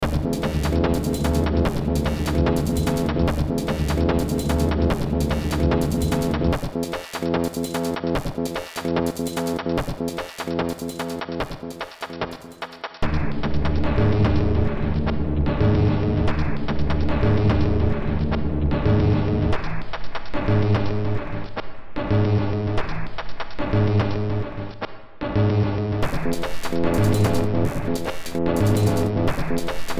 Music > Multiple instruments
Short Track #3652 (Industraumatic)
Ambient, Cyberpunk, Games, Horror, Industrial, Noise, Sci-fi, Soundtrack, Underground